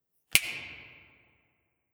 Sound effects > Human sounds and actions
Magic - Finger snap
A miraculous finger snap for any spell caster out there. * No background noise. * With reverb. * Clean sound, close range. Recorded with Iphone or Thomann micro t.bone SC 420.
bones, break, crack, divine, flash, holy, magical, snapping, sorcerer